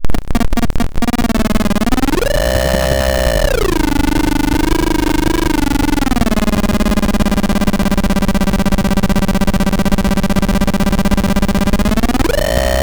Sound effects > Electronic / Design
Optical Theremin 6 Osc dry-049
Handmadeelectronic, Dub, SFX, Digital, Glitch, Trippy, Electro, Spacey, Infiltrator, Robot, Robotic, Theremin, Glitchy, noisey, Optical, FX, Theremins, Electronic, Experimental, Noise, Sci-fi, Bass, DIY, Synth, Analog, Instrument, Otherworldly, Alien, Sweep, Scifi